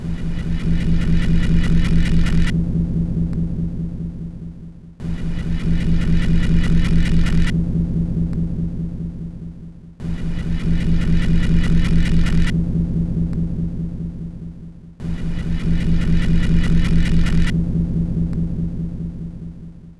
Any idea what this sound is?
Soundscapes > Synthetic / Artificial
This 96bpm Ambient Loop is good for composing Industrial/Electronic/Ambient songs or using as soundtrack to a sci-fi/suspense/horror indie game or short film.
Drum, Packs, Soundtrack, Ambient, Dark, Loopable